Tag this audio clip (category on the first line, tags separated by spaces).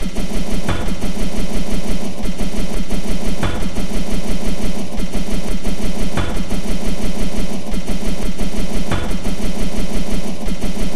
Instrument samples > Percussion
Loopable Packs Industrial Samples Soundtrack Underground Dark Weird Ambient Alien Loop Drum